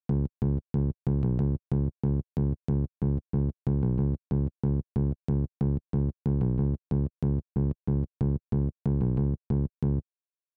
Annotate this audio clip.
Music > Solo instrument
Acid loop recording from hardware Roland TB-03
Recording, Acid, 303, techno, hardware, TB-03, synth, electronic, house, Roland